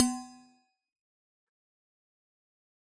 Sound effects > Objects / House appliances
Resonant coffee thermos-034
percusive, recording